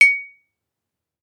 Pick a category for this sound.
Sound effects > Other mechanisms, engines, machines